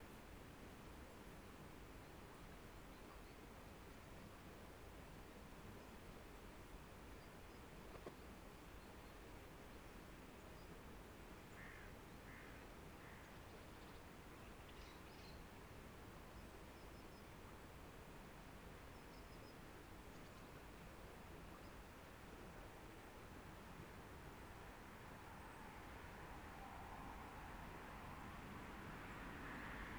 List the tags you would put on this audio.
Soundscapes > Nature
stream countryside cars forest

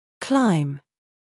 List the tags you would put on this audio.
Speech > Solo speech
english pronunciation voice

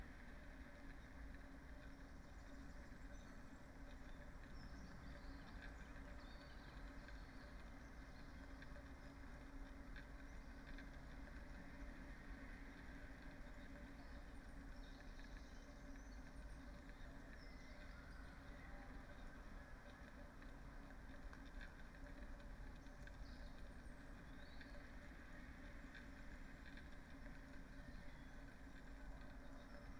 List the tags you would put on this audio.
Soundscapes > Nature
natural-soundscape alice-holt-forest Dendrophone phenological-recording soundscape artistic-intervention data-to-sound weather-data raspberry-pi modified-soundscape nature field-recording

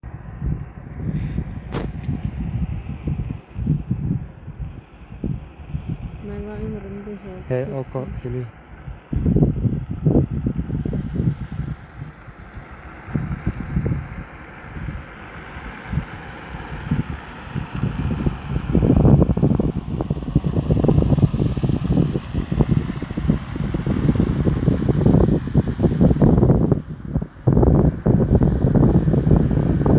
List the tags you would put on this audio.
Soundscapes > Urban
car tyres city driving

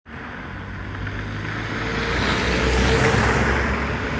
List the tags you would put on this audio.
Sound effects > Vehicles
automobile car vehicle

